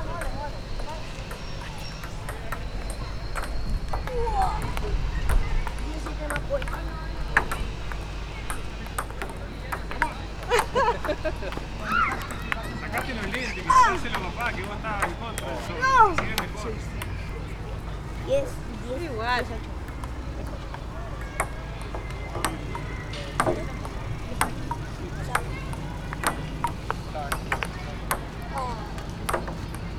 Soundscapes > Urban
20251024 ParcCanBatllo Humans Voices PingPong Energetic
Energetic, Humans, PingPong, Voices